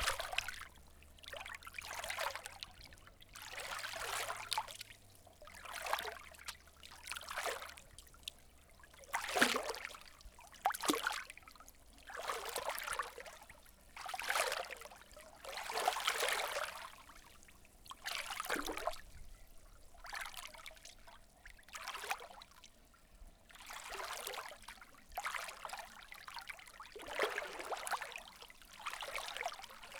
Sound effects > Natural elements and explosions
Light Splashing Waves
Some light splashing of water I recorded at a swimming pool in Italy in the summer of 2024. Recorded on a Zoom H4n Pro Black, slightly edited in Reaper, mostly cutting out some not so usable parts.
Swimming, Waves, Water, pool, Splash